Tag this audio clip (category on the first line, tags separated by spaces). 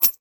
Sound effects > Other
small metallic interface ui change glint coins game money jingle ring cash loose